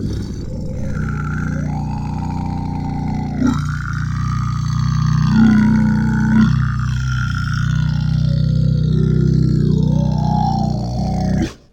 Sound effects > Experimental
Creature Monster Alien Vocal FX-55
Alien, Animal, boss, Creature, Deep, demon, devil, Echo, evil, Fantasy, Frightening, fx, gamedesign, Groan, Growl, gutteral, Monster, Monstrous, Ominous, Otherworldly, Reverberating, scary, sfx, Snarl, Snarling, Sound, Sounddesign, visceral, Vocal, Vox